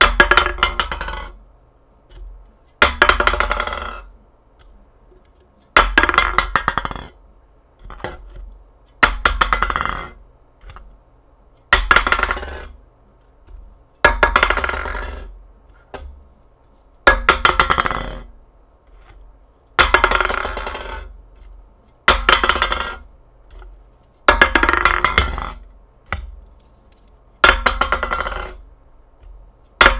Sound effects > Objects / House appliances
OBJCont-Blue Snowball Microphone, CU Aluminum Can, Drop Nicholas Judy TDC
An aluminum can being dropped.